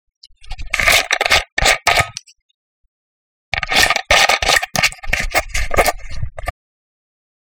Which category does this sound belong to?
Sound effects > Objects / House appliances